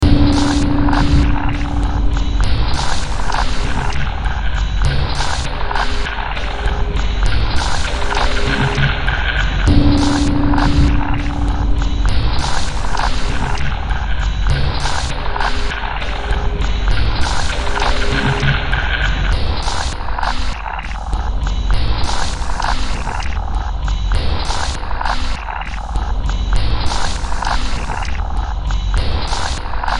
Music > Multiple instruments
Demo Track #3114 (Industraumatic)
Ambient, Cyberpunk, Games, Horror, Industrial, Noise, Sci-fi, Soundtrack, Underground